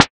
Instrument samples > Synths / Electronic
SLAPMETAL 8 Db
fm-synthesis, additive-synthesis